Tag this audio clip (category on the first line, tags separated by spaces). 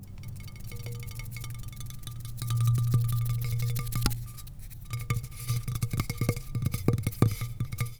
Music > Solo instrument
block foley fx keys loose marimba notes oneshotes perc percussion rustle thud tink wood woodblock